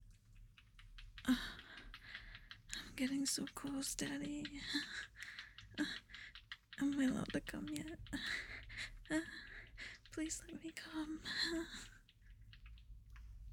Speech > Solo speech
Please Let Me Cum!
Please let me cum Daddy!
Naughty, Sub